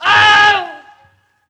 Sound effects > Human sounds and actions
Wilhelm Scream Alternative 4
death, fall, killed, legend, legendary, male, man, meme, pain, scream, shout, shouting, wilhelm, wilhelmscream, willhelm, willhelmscream